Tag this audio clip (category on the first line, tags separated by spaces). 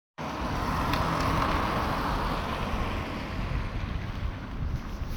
Soundscapes > Urban
tires studded